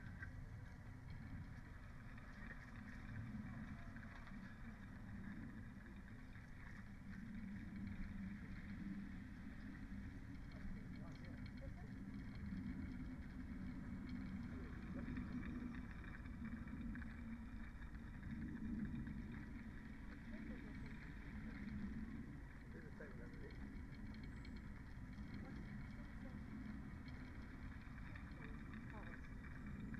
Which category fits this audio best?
Soundscapes > Nature